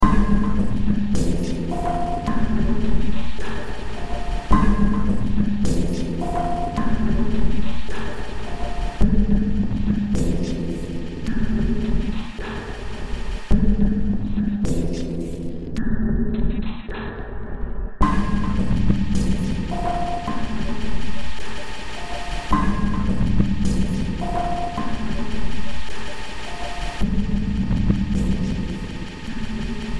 Music > Multiple instruments
Demo Track #3829 (Industraumatic)
Ambient, Cyberpunk, Games, Underground, Soundtrack